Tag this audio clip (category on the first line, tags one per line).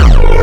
Instrument samples > Synths / Electronic
bass
basshit
distortion
lowbass
synth